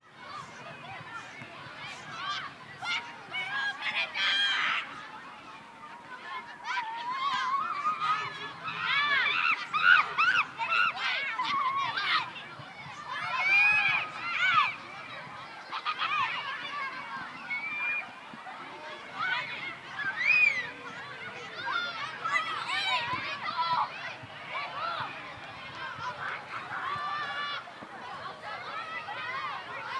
Soundscapes > Urban
A public sports field at lunchtime adjacent a school on an overcast and rainy spring day.
playground, screaming, yelling, children, kids, playing, shouting, ambience, oval